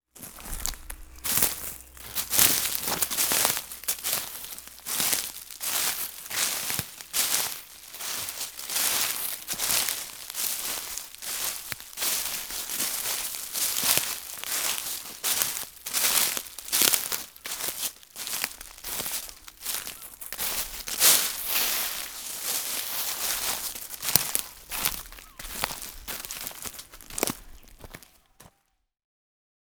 Soundscapes > Nature
Down in the local woods, Spring. Tascam Dr-05
Crunch, leaves, rustle, rustling, twigs, Walking